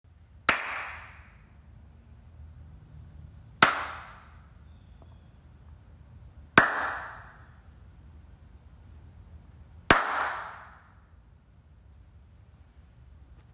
Sound effects > Human sounds and actions
Hand claps in Empty House
The reverberant sound of handclaps in an empty house.
handclap, clap, reverb, handclaps, echo, claps